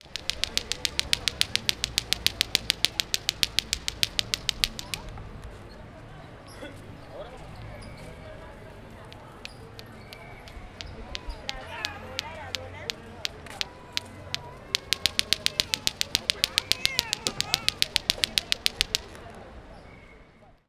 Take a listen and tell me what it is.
Music > Solo percussion
Trikitraca juego para niños children game San Salvador
Percussive sound of the trikitraca, a game made with two little balls attached with a rope. Recorded in the historic centre of San Salvador using a Zoom H5.
America, El, field, game, kids, percussion, recording, Salvador